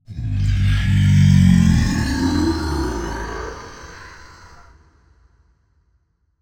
Sound effects > Experimental
Creature Monster Alien Vocal FX (part 2)-041
Alien, bite, Creature, demon, devil, dripping, fx, gross, grotesque, growl, howl, Monster, mouth, otherworldly, Sfx, snarl, weird, zombie